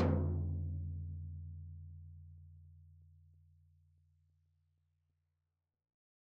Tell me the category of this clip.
Music > Solo percussion